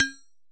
Sound effects > Objects / House appliances
Empty coffee thermos-004
recording, percusive, sampling